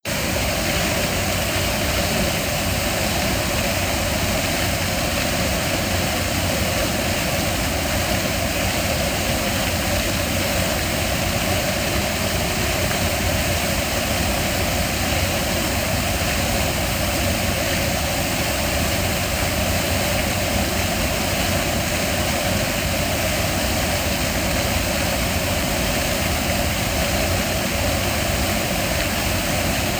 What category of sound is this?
Soundscapes > Urban